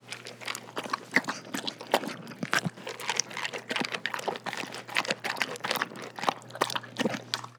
Sound effects > Animals
Dog Chewing
If some sounds are ASMR, this is the opposite. Recording of my dog eating dinner extremely close mik'ed with the Lom Usi. Definitely a weird one.